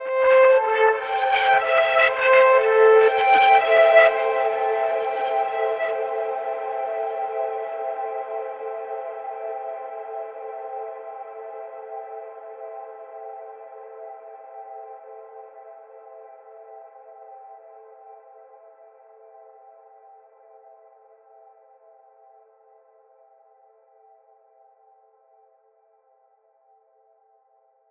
Sound effects > Electronic / Design
SELF DECAY
An atmospheric, ambient texture focused on the tail of a sound. It begins with a soft, choral or pad-like chord that slowly dissolves into a long, spacious reverb, creating a sense of distance and melancholy.
ambient, electronic, fx, sound-design, sound-effect, synthetic, texture